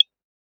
Sound effects > Objects / House appliances
Masonjar Fill 2 Drop
Filling a 500ml glass mason jar with water, recorded with an AKG C414 XLII microphone.
mason-jar, water, drop